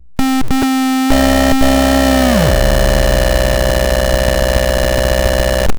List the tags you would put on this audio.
Sound effects > Electronic / Design
Alien
Glitchy
Optical
Sci-fi

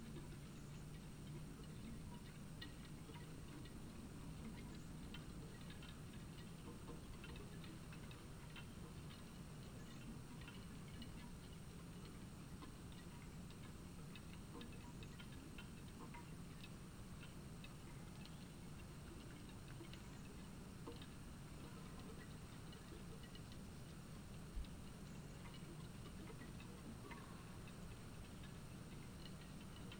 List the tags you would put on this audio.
Soundscapes > Nature
field-recording
natural-soundscape
soundscape
sound-installation
data-to-sound
weather-data
nature
phenological-recording
artistic-intervention
alice-holt-forest
modified-soundscape
raspberry-pi
Dendrophone

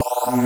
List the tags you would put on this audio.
Sound effects > Electronic / Design
alert
button
Digital
interface
menu
notification
options
UI

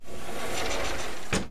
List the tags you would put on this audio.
Sound effects > Objects / House appliances
recording
soundeffect

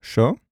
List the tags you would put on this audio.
Speech > Solo speech
Sennheiser
Voice-acting
sure
mid-20s
FR-AV2
Hypercardioid
2025
questioning
VA
Shotgun-mic
MKE-600
MKE600
Calm
Generic-lines
Single-mic-mono
hesitant
Tascam
Male
july
Shotgun-microphone
Adult

